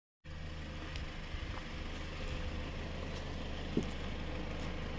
Sound effects > Other mechanisms, engines, machines
clip auto (16)
Auto, Avensis, Toyota